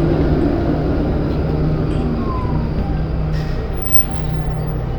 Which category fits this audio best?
Sound effects > Vehicles